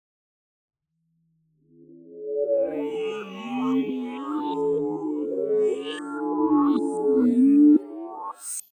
Sound effects > Electronic / Design
Sample used from: TOUCH-LOOPS-VINTAGE-DRUM-KIT-BANDLAB. I did some ring mod and big stretch for it with Flstudio sampler. Processed with Vocodex, ZL EQ and Fruity Limiter.